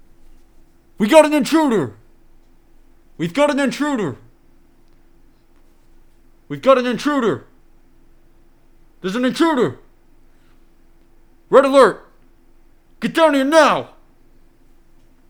Speech > Solo speech
Henchman Intruder Alert
Send us what you use it in! We'd love to see your work. Check it out here!
alarm, alert, combat, enemy, fighting, goon, Henchman, punch, thug